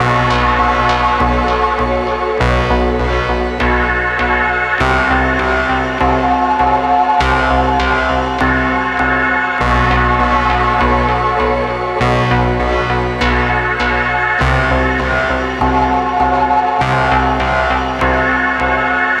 Music > Solo instrument
100 D SK1Polivoks 02
Polivoks, Vintage, Analogue, Soviet, Electronic, Loop, 80s, Casio, Brute, Melody, Synth, Analog, Texture